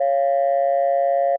Instrument samples > Synths / Electronic
Landline Phonelike Synth C#6
I was messing around in FL Studio using a tool/synth called Fluctus. It's basically a synth which can produce up to 3 concurrent tones. With two sine waves, the second of which tuned to a just-intuned minor 3rd (386 cents) above the first tone, each tone makes a sort of "holding tone" that is reminiscent of land-line phones.